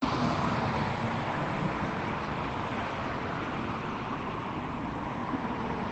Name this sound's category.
Sound effects > Vehicles